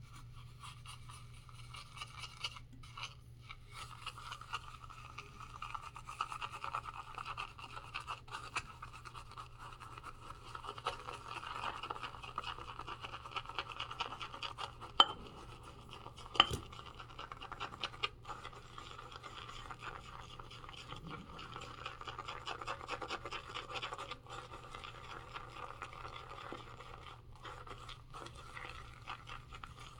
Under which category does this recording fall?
Sound effects > Human sounds and actions